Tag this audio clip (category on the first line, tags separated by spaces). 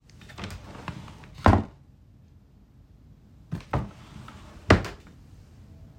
Sound effects > Objects / House appliances
bedroom drawer dresser house household